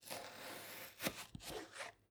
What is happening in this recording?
Sound effects > Other
Long slice vegetable 7
Chef, Chief, Cook, Cooking, Cut, Home, Indoor, Kitchen, Knife, Slice, Vegetable